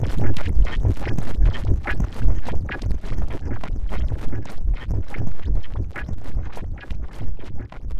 Sound effects > Electronic / Design
Basement is Flooding
commons, creative, free, industrial-noise, noise, royalty, sci-fi, scifi, sound-design